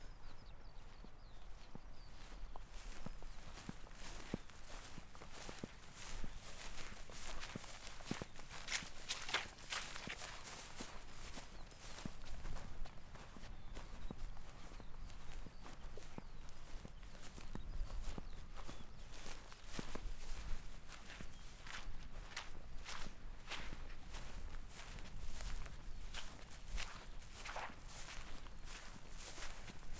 Soundscapes > Nature
footsteps marshy ground 1
walking in May in the marshy fields of the island of Foula. Recorded with a zoom H5